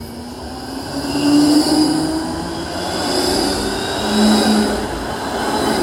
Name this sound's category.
Sound effects > Vehicles